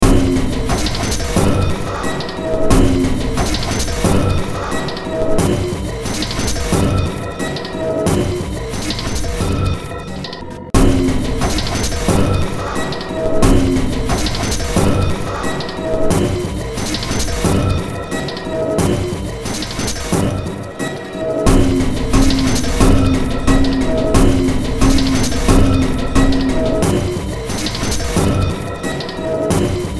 Music > Multiple instruments
Short Track #4004 (Industraumatic)
Noise, Soundtrack, Horror, Industrial